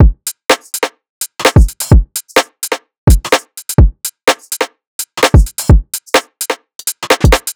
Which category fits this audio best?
Music > Solo percussion